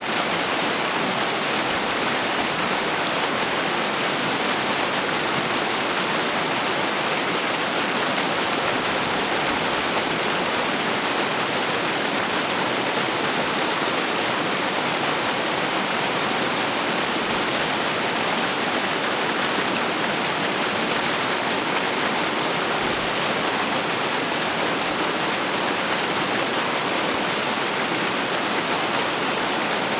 Soundscapes > Nature
WATRFall Urban creek 1m drop
Water cascading 1 metre over rocks in an urban concrete creek after a night of rain, moderate flow.
babbling, brook, cascade, creek, fall, flow, flowing, liquid, rocks, sfx, splash, stream, water